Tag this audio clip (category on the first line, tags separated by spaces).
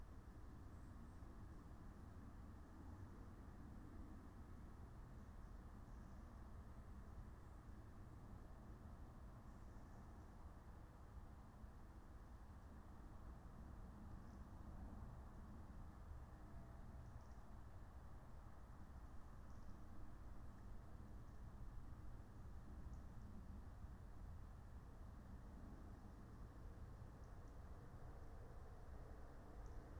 Soundscapes > Nature
soundscape alice-holt-forest field-recording raspberry-pi natural-soundscape meadow phenological-recording nature